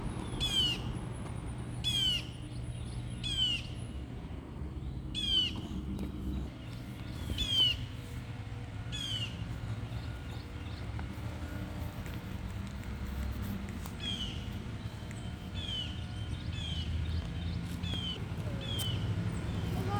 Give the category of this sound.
Soundscapes > Urban